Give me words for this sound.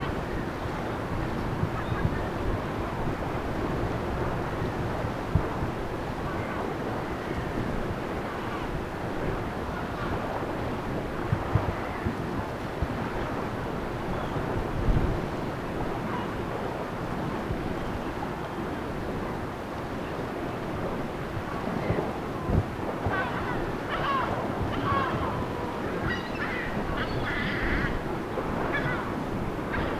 Soundscapes > Nature
lake shore-day 2
Ambient recording in a lake shore. Medium wind. Some seagulls and dogs might be heard.
dogs, lake, shore, waves, wind